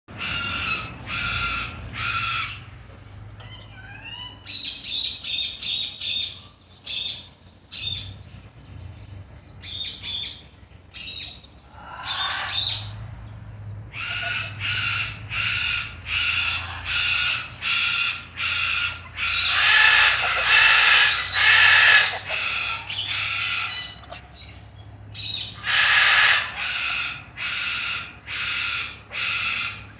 Sound effects > Animals
Zoo - Ambience; Various Parrots and Spider Monkey
Black-handed spider monkey, cockatoo, rose-ringed parakeet, and blue-and-gold macaws can be heard among others. Recorded with an LG Stylus 2022.
aviary, bird, birds, exotic, jungle, macaw, parrot, tropical, zoo